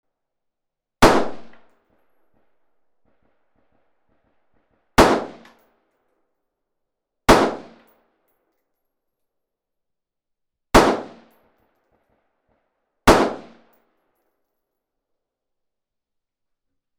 Sound effects > Natural elements and explosions
Recorded with Portacapture X8.